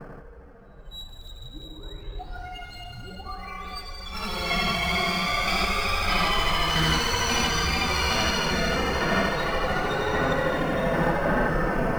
Sound effects > Electronic / Design
Murky Drowning 12
PPG-Wave, dark-soundscapes, dark-techno, vst, drowning, cinematic, dark-design, sci-fi, noise, content-creator, mystery, noise-ambient, horror, sound-design, science-fiction, scifi